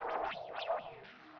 Soundscapes > Synthetic / Artificial
LFO Birdsong 22
birds, lfo, massive